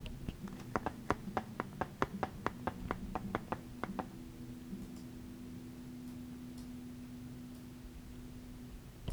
Sound effects > Human sounds and actions
Footsteps running from afar
Created this sound effect using my shoes and gently padding them on a hardwood floor. Originally made to mimic the sound of someone running in the distance.
floor, run, footsteps